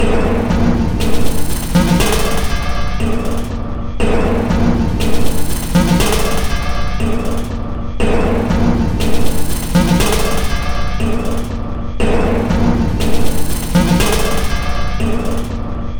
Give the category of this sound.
Instrument samples > Percussion